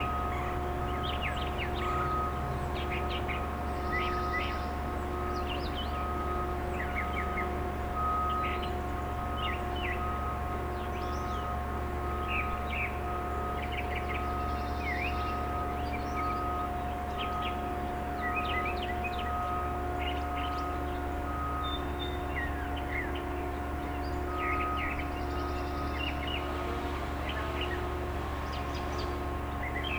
Sound effects > Other mechanisms, engines, machines
A Spring Day With Machinery and Birds In A Residential Neighborhood-001
This is part 1 of a 3 part field recording taken one spring day in the neighborhood. This first half features an outdoor machine of some sort when they working on the sewage lines and trees.
noise, road-work, outdoor-work, machine, outdoor-machinery, machinery, machine-noise, machines